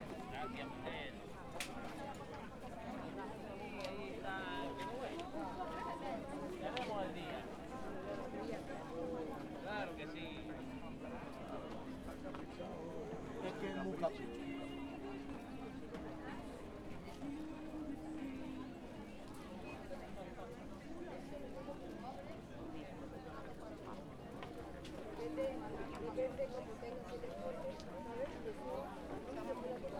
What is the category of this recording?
Soundscapes > Urban